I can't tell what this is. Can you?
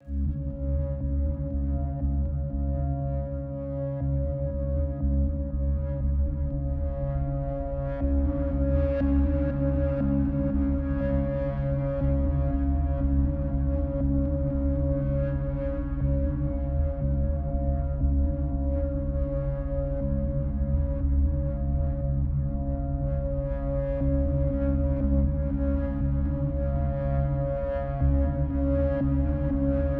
Music > Multiple instruments
The Sound is mostly designed around a set of Pink and White Noises From Pigment's Engines and sequenced through it, The result is Processed Through various AIR & native Studio one plugins, also there's an EQ automation used for around 500hz, to cut out the sound and fading it in/out in the right time.